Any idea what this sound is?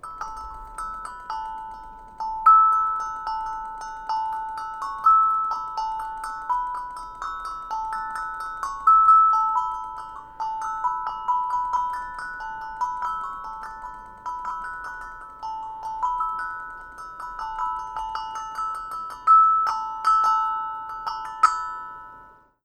Music > Solo percussion
MUSCTnprc-Blue Snowball Microphone, CU Steel Tongue Drum, Notes, Random Nicholas Judy TDC
Random steel tongue drum notes.
note, steel-tongue-drum, random, Blue-brand, Blue-Snowball